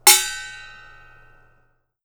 Sound effects > Objects / House appliances
METLImpt-Blue Snowball Microphone Metal, Clang, Thin 03 Nicholas Judy TDC
A thin metal clang.
Blue-brand, Blue-Snowball, metal